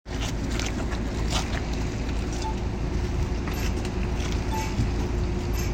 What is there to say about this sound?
Soundscapes > Urban
Bus door opening 1 8
Where: Tampere Keskusta What: Sound of a bus door opening Where: At a bus stop in the morning in a mildly windy weather Method: Iphone 15 pro max voice recorder Purpose: Binary classification of sounds in an audio clip